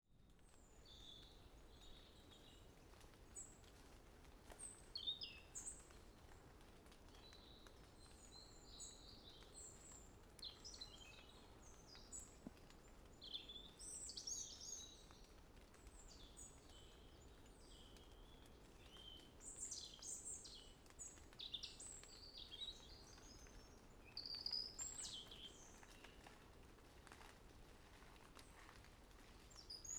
Soundscapes > Nature
Forest. Birds. Rain. Light rain
Birds, Forest, Rain